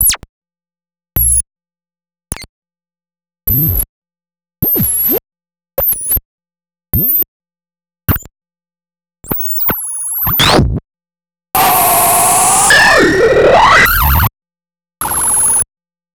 Sound effects > Electronic / Design
NNC sherm-blips 03
Electronic blips n yips from Sherman filterbank